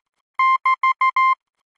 Sound effects > Electronic / Design
Morse SignoIgual
A series of beeps that denote the equal sign in Morse code. Created using computerized beeps, a short and long one, in Adobe Audition for the purposes of free use.
Language, Morse, Telegragh